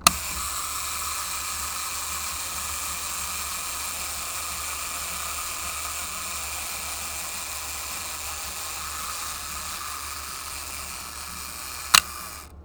Sound effects > Objects / House appliances
COMCam-Blue Snowball Microphone, CU Canon DL 9000, Click, Auto Wind, Shutter Nicholas Judy TDC
A Canon DL-9000 camera click, auto winding then shutter.
camera, Blue-brand, shutter, auto-winder, click, canon, dl-9000, Blue-Snowball